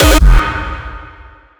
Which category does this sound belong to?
Instrument samples > Percussion